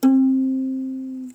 Instrument samples > String
Ukulele pluck
Plucked ukulele chord with lots of delay
delay, ethereal, pluck, processedstring, ukulele